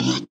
Speech > Solo speech
Random Brazil Funk Volcal Oneshot 1
Recorded with my Headphone's Microphone, I was speaking randomly, I even don't know that what did I say，and I just did some pitching and slicing works with my voice. Processed with ZL EQ, ERA 6 De-Esser Pro, Waveshaper, Fruity Limiter.
Acapella, BrazilFunk, EDM, Vocal